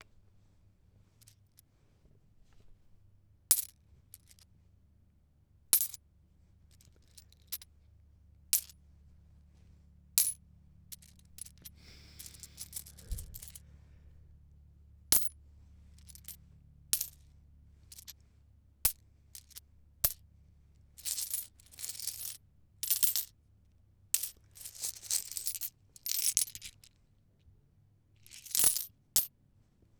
Sound effects > Objects / House appliances
Coin noises recorded using the Zoom H2 Handy recorder under a blanket to avoid reverb as much as possible. Sorry for the breathing noises, it's hot under a blanket.